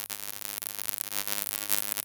Sound effects > Electronic / Design
my brothers mic static longer Original